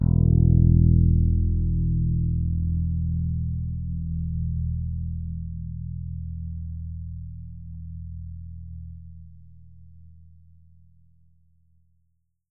Instrument samples > String
E1 - Bass Guitar Lightly Finger Picked
E1 string lightly finger picked and palm muted on a Squire Strat converted Bass. Static reduced with Audacity.